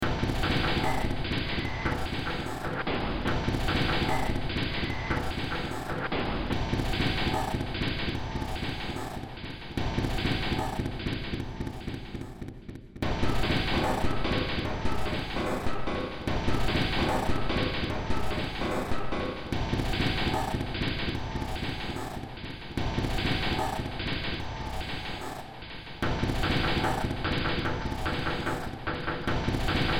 Music > Multiple instruments
Short Track #3594 (Industraumatic)
Ambient, Cyberpunk, Games, Horror, Industrial, Noise, Sci-fi, Soundtrack, Underground